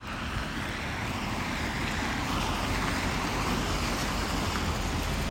Sound effects > Vehicles
Car-passing 23
car, drive, engine, hervanta, outdoor, road, tampere